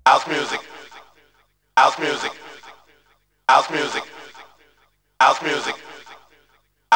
Speech > Solo speech
Vocal House Music 48-16
HOUSE MUSIC vocal Sample